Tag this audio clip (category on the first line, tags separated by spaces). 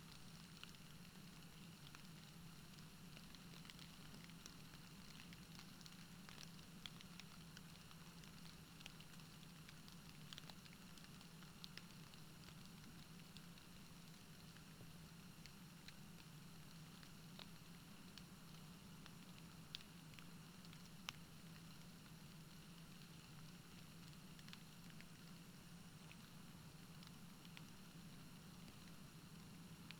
Soundscapes > Nature
weather-data nature sound-installation data-to-sound phenological-recording artistic-intervention raspberry-pi soundscape Dendrophone field-recording modified-soundscape alice-holt-forest natural-soundscape